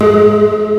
Instrument samples > Synths / Electronic
Weird synth sound created in audacity for your use in any project. Credit fizzel makes noises